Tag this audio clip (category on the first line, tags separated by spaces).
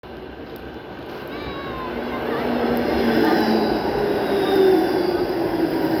Soundscapes > Urban
rail tram tramway